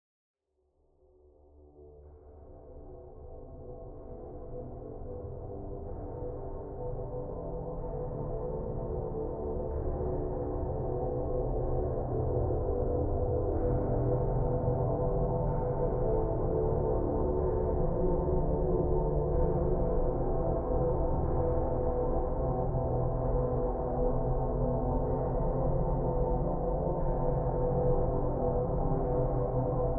Soundscapes > Synthetic / Artificial
was made for fun, can be used for games. made in fl studio 2024